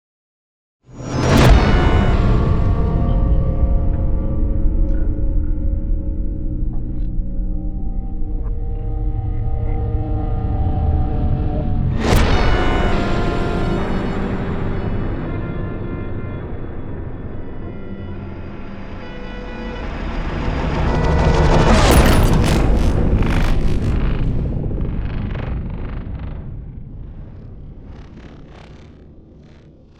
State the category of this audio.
Sound effects > Other